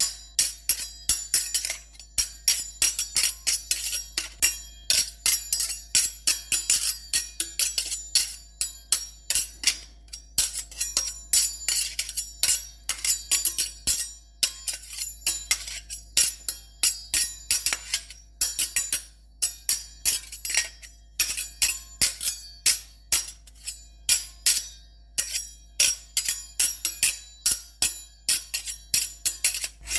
Sound effects > Objects / House appliances
WEAPSwrd-Samsung Galaxy Smartphone, CU Swords Fighting Nicholas Judy TDC
Phone-recording, knife